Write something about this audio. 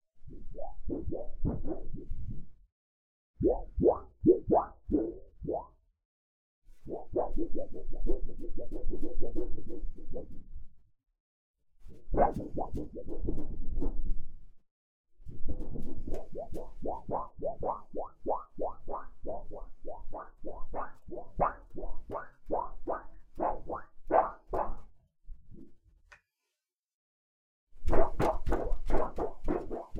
Sound effects > Other
Plastic Sheet Wobble
The wobbling sound of a plastic sheet being wiggled back and forth.